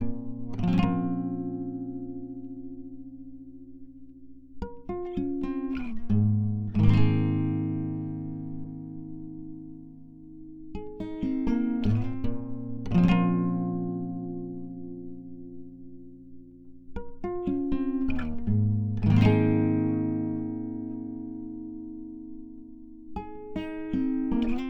Music > Solo instrument
acoustic guitar sus chords
acosutic, chord, chords, dissonant, guitar, instrument, knock, pretty, riff, slap, solo, string, strings, twang